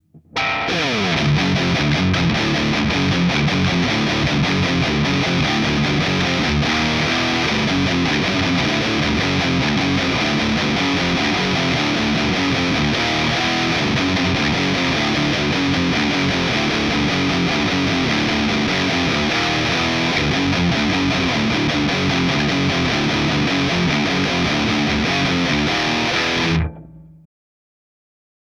Music > Solo instrument
Heavy Metal style riffs made by me, using a custom made Les Paul style guitar with a Gibson 500T humbucker. 5150 TS profile used via a Kemper Profiler Amp, recorded on Reaper software.